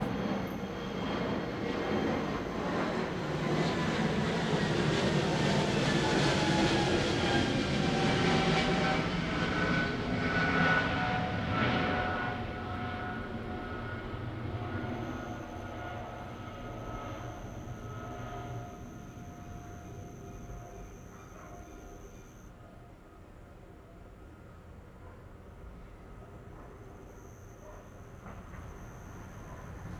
Sound effects > Vehicles
Airplane fly-over from a residential home near the airport.